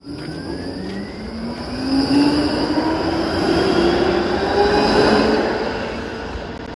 Soundscapes > Urban
Tram passing Recording 33
Rail, Train, Tram